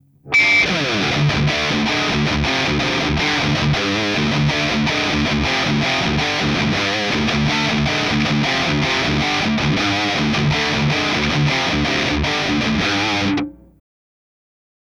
Music > Solo instrument
Heavy Metal style riffs made by me, using a custom made Les Paul style guitar with a Gibson 500T humbucker. 5150 TS profile used via a Kemper Profiler Amp, recorded on Reaper software.
Metal, heavy